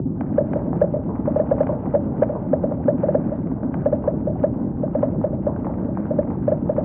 Sound effects > Objects / House appliances
Boiling Water1(Pink Noise Paded)
Hi ! That's not recording sound :) I synth it with phasephant!